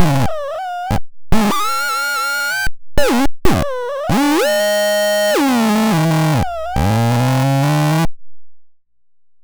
Sound effects > Electronic / Design
Optical Theremin 6 Osc dry-027
Dub, Synth, Handmadeelectronic, Trippy, Optical, Otherworldly, Sci-fi, Digital, Alien, Electronic, noisey, Bass, Glitch, Analog, Glitchy, Electro, FX, Robotic